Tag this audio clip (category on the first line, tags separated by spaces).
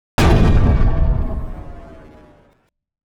Sound effects > Other
audio bang blunt cinematic collision crash design effects explosion force game hard heavy hit impact percussive power rumble sfx sharp shockwave smash sound strike thud transient